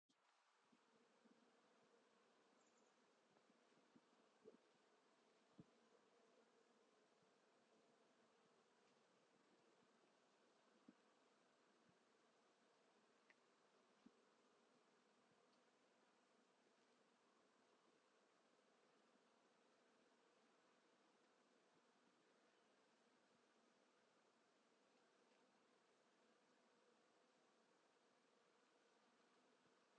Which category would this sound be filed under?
Soundscapes > Nature